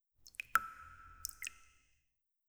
Sound effects > Natural elements and explosions
Wet water 02
Recorded sound of drops recorded while making some green tea. Tascam DR-05.
drip, wet, splash, water, reverb, drop, tascamDR05